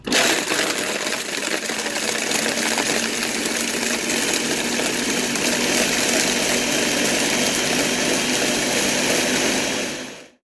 Sound effects > Objects / House appliances
MACHAppl-Samsung Galaxy Smartphone, CU Blender, On, Ice Blended Nicholas Judy TDC
A blender turning on and ice being blended.